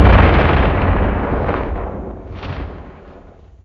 Instrument samples > Percussion
landslider longdrum 1
aliendrums, ground-shattering, displacement, groundshift, drum, cascade, landslide, tumble, stones, cascading, crumble, stone, soil, drums, avalanche, terrain, quake, descent, falling, alienware, weird-drums, tectonic, upheaval, dirt, rupture, earthshifting, rocks, shift